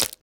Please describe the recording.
Sound effects > Objects / House appliances
Bone crack
Stereo recording of baking paper snapping